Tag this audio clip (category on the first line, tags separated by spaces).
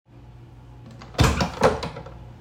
Sound effects > Objects / House appliances
Break Smash Wood